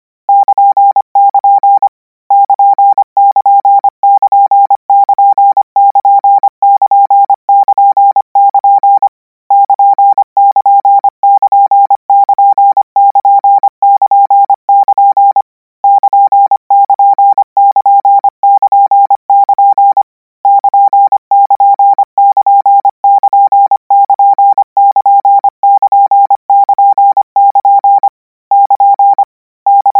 Sound effects > Electronic / Design
Koch 48 ( - 200 N 25WPM 800Hz 90%
Practice hear symbol '(' use Koch method (practice each letter, symbol, letter separate than combine), 200 word random length, 25 word/minute, 800 Hz, 90% volume.
code, codigo, morse, radio, symbols